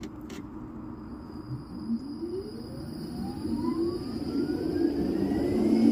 Soundscapes > Urban
final tram 34
tram, hervanta